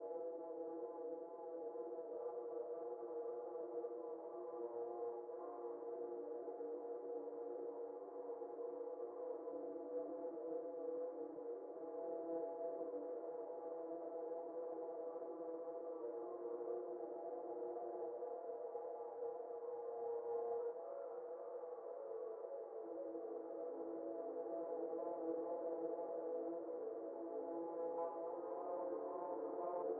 Music > Multiple instruments
Weather Radar (Ambient)
recorded with Fl Studio 10
ambiance, ambience, ambient, atmo, atmosphere, experimental, rain, raindrop, reverb, sky, snow, snowflake, soundscape, water, wind